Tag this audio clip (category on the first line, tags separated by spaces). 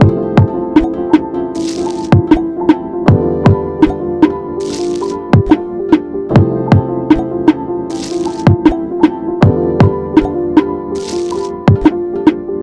Music > Multiple instruments
Lo-fi Loop Calm Piano